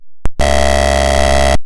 Sound effects > Electronic / Design
Optical Theremin 6 Osc dry-045
Spacey, Experimental, Electronic, Sweep, Infiltrator, Synth, Analog, Robotic, Digital, Dub, Noise, Glitchy, noisey, Robot, Instrument, Otherworldly, Theremin, Optical, SFX, Alien, FX, Handmadeelectronic, DIY, Bass, Theremins, Sci-fi, Trippy, Glitch, Electro, Scifi